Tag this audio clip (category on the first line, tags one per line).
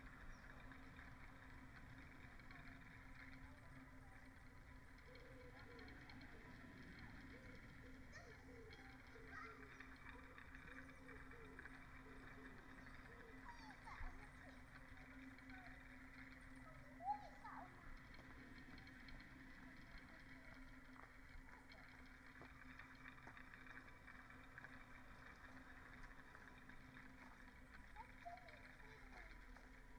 Soundscapes > Nature
weather-data
natural-soundscape
modified-soundscape
raspberry-pi
phenological-recording
Dendrophone
field-recording
alice-holt-forest
artistic-intervention
data-to-sound
soundscape
nature
sound-installation